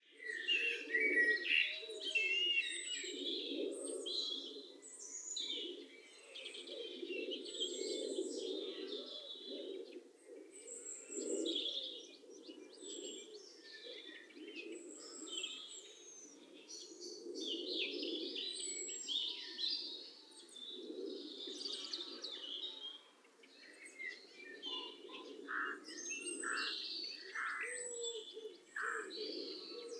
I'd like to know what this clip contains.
Soundscapes > Nature
An edited recording at RSPB Campfield Marsh using RX11.